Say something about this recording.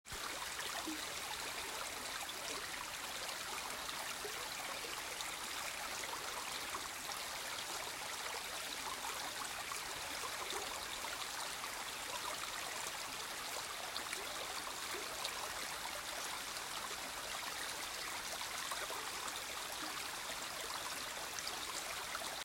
Soundscapes > Nature
The beck in Keighley, West Yorkshire